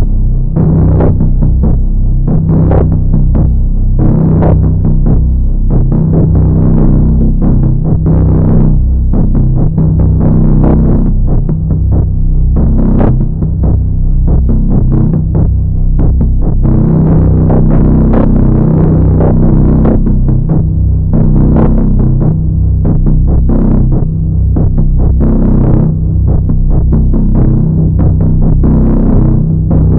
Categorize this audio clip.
Music > Multiple instruments